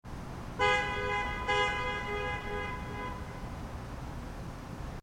Sound effects > Vehicles
Faint city ambience Car honk
street, traffic, city, street-noise, urban